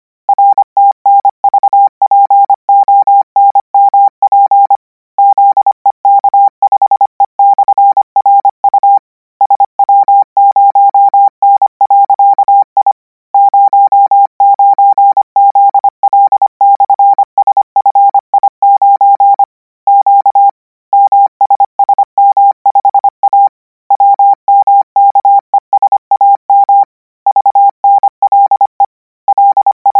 Sound effects > Electronic / Design
Koch 26 KMRSUAPTLOWI.NJEF0YVGS/Q9Z - 720 N 25WPM 800Hz 90%
Practice hear characters 'KMRSUAPTLOWI.NJEF0YVGS/Q9Z' use Koch method (after can hear charaters correct 90%, add 1 new character), 720 word random length, 25 word/minute, 800 Hz, 90% volume. Code: rtnvponmp zek5e/ru sw0n.i 09zl/sfi9 q mssm5a wmkesam vnle lfjp5pyg 0ap/wo eau0 5gi 0pom ewi ft.ws0mw 95o wf5s/ykw 0fz5jft / lww mn9rn ntsuutrq .nk nq aug uanfyfsfv o ke.u9es s0tlptmii eesut55ij p 9ljij. y5a aje.0rq veg9/ vopieao //.wk ppei pqel/arim lv50zlot0 af/e rq9 w/zt.lu0. yj jivnvu/ .muiv5ny5 sypw ffqrl yoas 5/lvoqqnw wqwl nrn psfal /k.u fz mynkesw etyqj zst l9.fki .0zsf p 0pr/ iiza enwggg y v55 t.e.uvy .kvly vg.uoz uv oj5uo0vys awszz kgqy 0..q9i uame5 vu ./5qsaksp sknqm .e wmgf 9asq9onnt .ifj /pvzg rrr0ljy ursiar j0m9mno0m f feulvli vzakpts etas9p z5.0 iooske.vz sy9ly yqktu .ujfuo qljpv0p szlrur e5otgoj e ssyoj5 z jy /sl5i re 0wz9awjp fiuoq5usg g.5f0sjry yo uwti5q gnt 9u uoy5su g /wkjk 9 mlyjs0lk 0sa5mop awz9pq fkiy/i zv5jz 9mge z.ti ky m .z.uz /qlzqu/ awipa .
morse, codigo, characters